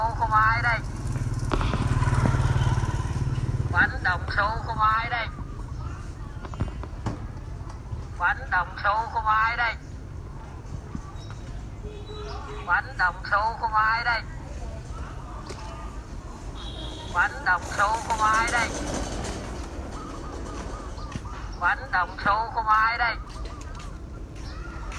Speech > Solo speech
Bánh Đồng Xu Phô Mai Đây
Man sell food. Record use i Phone 7 Plus smart phone 2025.09.16 06:21
business, male, man, sell, voice